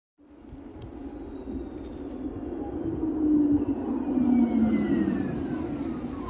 Soundscapes > Urban
final tram 32
finland, tram, hervanta